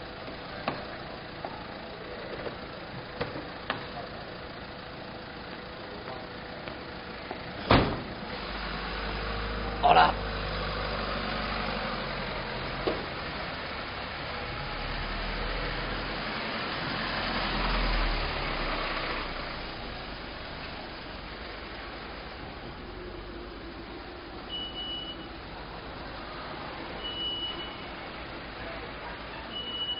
Sound effects > Human sounds and actions
Urban Ambience Recording in collab with Martí i Pous High School, Barcelona, March 2025, in the context of a sound safari to obtain sound objects for a sound narrative workshop. Using a Zoom H-1 Recorder.